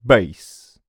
Speech > Solo speech
Saying Bass
chant, FR-AV2, hype, Male, Man, Mid-20s, Neumann, oneshot, singletake, Single-take, Tascam, U67, Vocal, voice, word